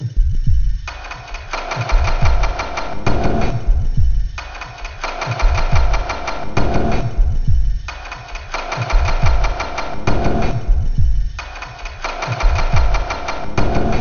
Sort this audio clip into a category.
Instrument samples > Percussion